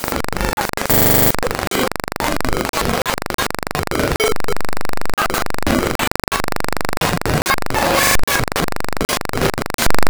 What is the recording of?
Sound effects > Other
Corrupted DV tape audio
Sounds of a DV tape being played by a half-functional Panasonic NV-GS330 Mini DV camcorder. Audio was captured directly from tape via Firewire.
strange, noise, electronic, harsh, digital, glitch, abstract, buzzing